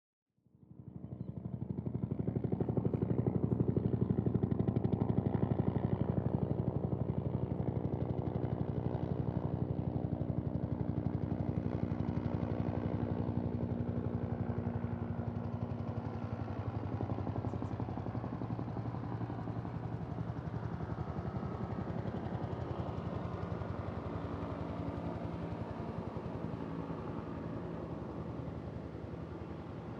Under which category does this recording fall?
Sound effects > Vehicles